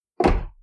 Sound effects > Vehicles
Car Door Close 2
A car door closing.
truck, slam, car, door, close, shut, vehicle